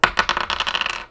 Sound effects > Objects / House appliances
FOLYProp-Blue Snowball Microphone, CU Seashell, Clatter 06 Nicholas Judy TDC
Blue-Snowball, clatter, seashell, Blue-brand, foley